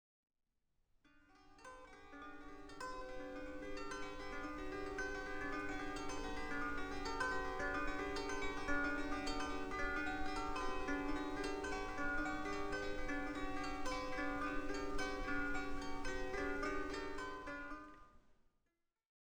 Music > Solo instrument
discordant dancing strings, open air 001
Discordant guitar sounds dancing around each other. Recorded with a Zoom H5 and edited in FL Studio, just added some reverb.
cinematic, strings